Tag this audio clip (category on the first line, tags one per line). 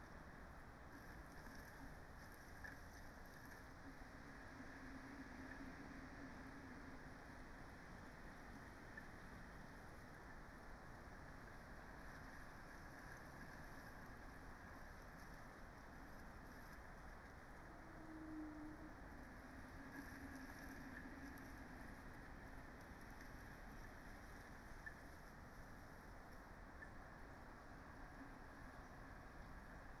Soundscapes > Nature
alice-holt-forest
raspberry-pi
field-recording
nature
modified-soundscape
soundscape
natural-soundscape